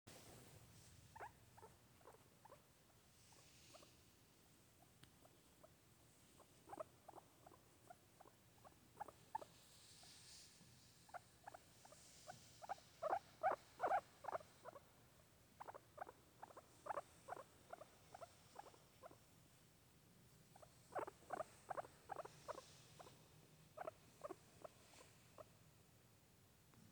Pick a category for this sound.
Sound effects > Animals